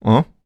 Speech > Solo speech
Confused - Uhn
confused, dialogue, FR-AV2, Human, Mid-20s, Neumann, NPC, singletake, sound, talk, Tascam, U67, Vocal, voice, Voice-acting